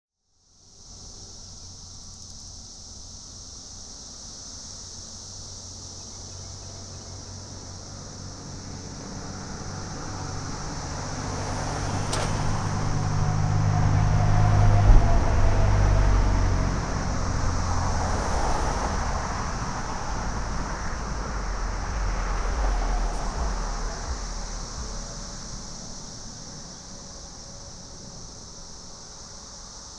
Soundscapes > Other
Kentucky Route 956 #1
Traffic on Kentucky Route 956, recorded where it crosses Silver Creek, just north of Berea, Kentucky USA. Recorded on 5 June2025 beginning at 13:49. Various cars, trucks, etc. Birds, frogs (at the creek) and insects (mostly cicadas). Microphone was on the south side of the road pointing north across the road. Westbound traffic moves right to left. On the right, is a pavement transistion from blacktop (the road) to textured concrete (the bridge). Mic was a Josephson C700S, configured as MS, converted to stereo in post. Recorder was a Sound Devices MixPre 10 II. Note on geolocation: At the time of uploading, the satellite photo shows the location in a field because the satellite photo used was taken before the extension of KY 956 was completed.
ambience, automobiles, birds, cars, field-recording, frogs, insects, traffic, trucks